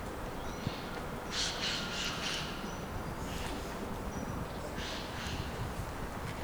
Soundscapes > Nature
20250312 Collserola Crow
Urban Ambience Recording in collab with Narcís Monturiol Institute, Barcelona, March 2025. Using a Zoom H-1 Recorder.
Nature, Crow, Collserola